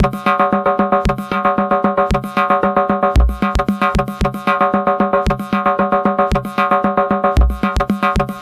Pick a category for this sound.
Music > Solo percussion